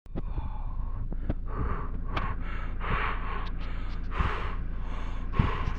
Sound effects > Other
Deep breathing sound effect. Recorded with Android mobile phone.
deep, breath, cold